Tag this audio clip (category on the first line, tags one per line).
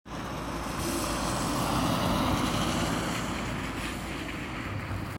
Sound effects > Vehicles
vehicle
tampere
rain